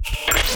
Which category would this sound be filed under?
Sound effects > Experimental